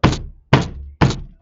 Sound effects > Objects / House appliances
banging on door, table, wood, metal, desk.
Made by slamming on my desk. Thank god nobody seemed to notice or care on whatever they were hearing.
desk, table, impact, wood